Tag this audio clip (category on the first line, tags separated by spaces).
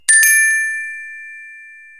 Sound effects > Electronic / Design
effects short